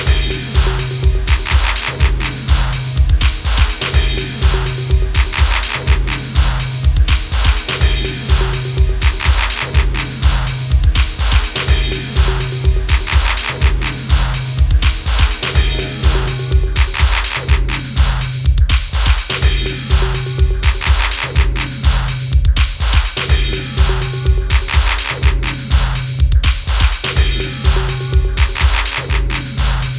Music > Multiple instruments
"Legowelt" type techno idea 124BPM Big Beat
Short 124bpm idea with the transition part inspired by Legowelt, made in FL11.